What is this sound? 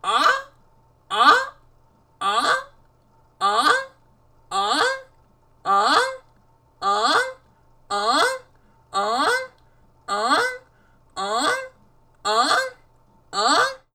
Sound effects > Animals
A canada goose honking. Human imitation. Cartoon.